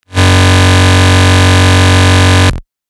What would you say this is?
Instrument samples > Synths / Electronic
Frenchcore Bass Testing 2-#F
Synthed with phaseplant only. A training of Frenchcore bass synth. Kilohearts Snap-in used: Disperser, Slice EQ, Shaper Table, Cliper, Khs Distortion.
Hardstyle, Distorted, Hard, Hardcore, Bass, Frenchcore